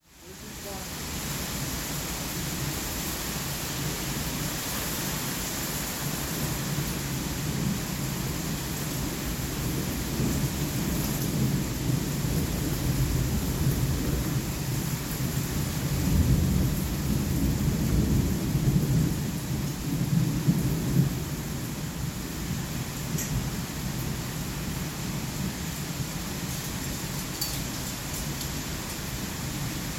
Soundscapes > Nature
STORM-Samsung Galaxy Smartphone, CU Big, Wind, Rain, Thunder, Juneteenth Nicholas Judy TDC
Big wind, rain and thunderstorm composite in Juneteenth.
big, field-recording, Phone-recording, rain, rainstorm, storm, thunder, thunderstorm, weather, wind, windstorm